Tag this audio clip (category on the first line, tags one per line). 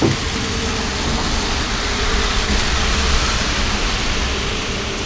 Sound effects > Vehicles
bus
transportation
vehicle